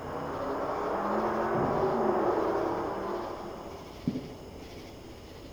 Sound effects > Other
Fireworks samples recorded during a heat wave in the southeast United States, July 4, 2025. Like a weed, the American Dream is constantly growing, under attack and evolving. Some people hate it and want to destroy it, some people tolerate living within it and some people glorify it.
sample-packs, fireworks, day, electronic, america, sfx, fireworks-samples, explosions, patriotic, free-samples, samples, United-States, independence, experimental